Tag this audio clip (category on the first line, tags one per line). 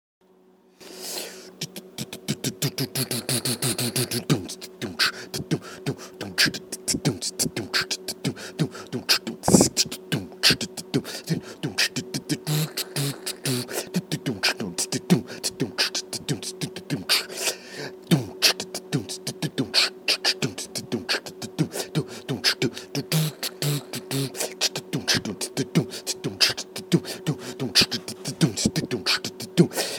Music > Other
Beat
Beat-box
Beatbox
Drumloop
Effect
Hihat
Human
Male
Man
Mouth
Pshit
Thump
Yell